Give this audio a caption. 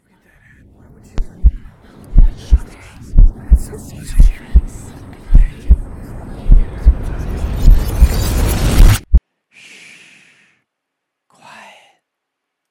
Speech > Conversation / Crowd
Internal Chatter.
Group, Chatter, Whisper